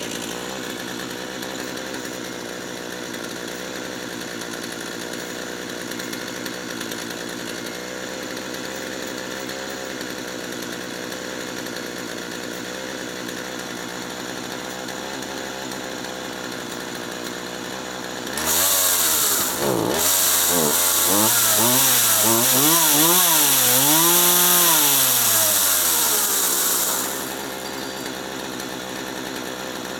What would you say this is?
Sound effects > Other mechanisms, engines, machines
Partner 351 chainsaw idling and revving. Excuse the very noisy chain #0:57 running slowly all the way to redline. Recorded with my phone.